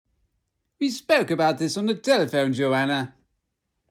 Speech > Solo speech
Me doing a silly posh English accent saying “we spoke about this on the telephone Joanna”